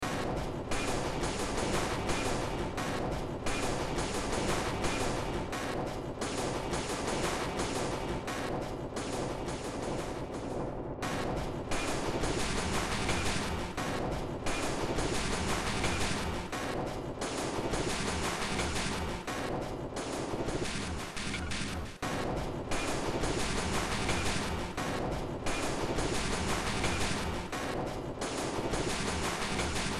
Music > Multiple instruments
Short Track #3118 (Industraumatic)
Noise, Games